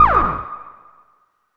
Instrument samples > Synths / Electronic
Benjolon 1 shot20
1 shot sound sampled from a modular synth. gear used: diy benjolin from kweiwen kit, synthesis technology e440 and e520, other control systems....... percussive modular synth hit. throw these in a sampler or a daw and GET IT
1SHOT, CHIRP, DRUM, HARSH, MODULAR, NOISE, SYNTH